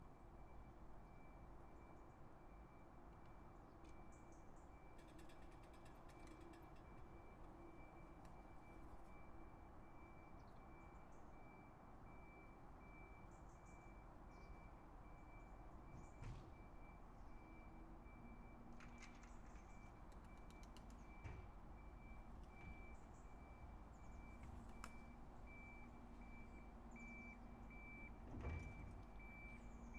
Sound effects > Other mechanisms, engines, machines
The noisy hydraulics of a wheelie bin lorry
hydraulics, whellie, lorry, bin, shrill, whine